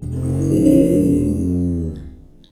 Sound effects > Experimental
Creature Monster Alien Vocal FX-47
Vocal, Reverberating, scary, Alien, devil, evil, sfx, boss, Creature, Growl, Sounddesign, Frightening, demon